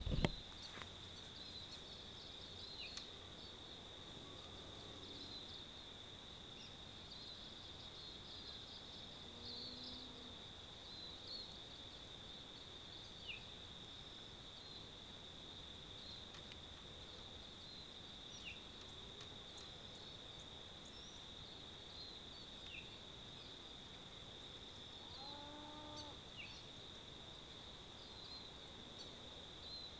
Soundscapes > Nature
Gentle Outdoor Ambience
Sorry about the handling noise at the very start of the clip. This clip was recorded on a Zoom H1N audio recorder, just next to a small river/creek. You can only just hear the water in this one, but I have uploaded another clip where the water noises are far more prominent. At one point you can hear a cow moo in the distance but that can be cut out. This will definitely seem like a boring clip but it is an effective clip for ambience.
Birds, Creek